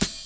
Instrument samples > Percussion
A Hi-hat closed using foot pedal
Cymbal, hi-hat, pedal